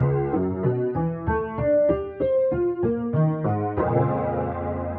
Music > Other
Unpiano Sounds 011
Distorted-Piano, Piano, Distorted